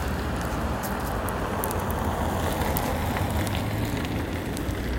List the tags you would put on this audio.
Sound effects > Vehicles
auto traffic city car field-recording street

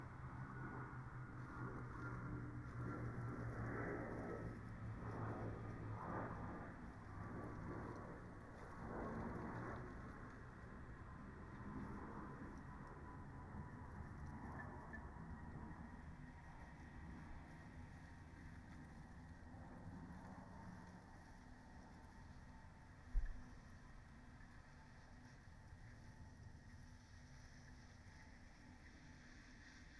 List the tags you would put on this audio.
Soundscapes > Nature
Dendrophone modified-soundscape weather-data sound-installation nature

